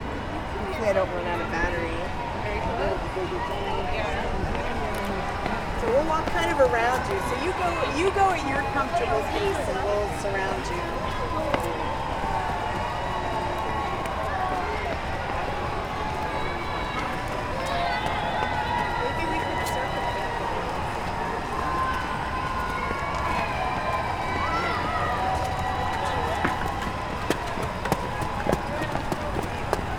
Soundscapes > Urban
NYC Marathon / 11.2.2025 12:18pm
Crowds cheering during the NYC marathon. Also: helicopters, muffled talking, playground sound, pigeons. Recorded by the participants of a puppet filmmaking workshop by The Stringpullers Puppet Co and the Green Feather Foundation for a film project.
cheering, crowd